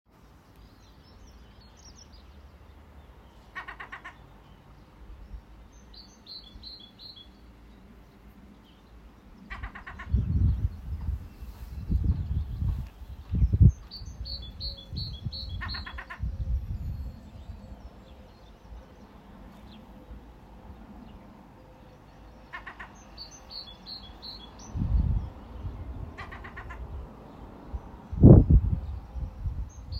Soundscapes > Nature
Seagull and birds chirping in the garden
This was recorded in Anglesey North Wales on the coast in the garden on a Spring afternoon with birds and seagulls in the background.
birds, botanical, field-recording, seagulls